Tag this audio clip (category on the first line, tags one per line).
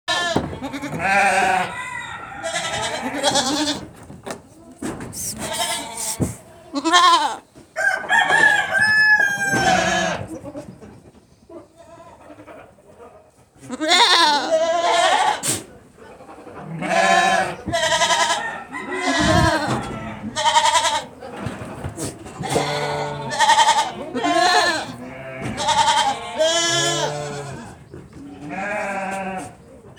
Sound effects > Animals
rooster
farm
barnyard
barn
goat
guineafowl
sheep
livestock